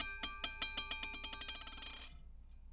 Sound effects > Objects / House appliances
Metal Tink Oneshots Knife Utensil 7
Beam, Clang, ding, Foley, FX, Klang, Metal, metallic, Perc, SFX, ting, Trippy, Vibrate, Vibration, Wobble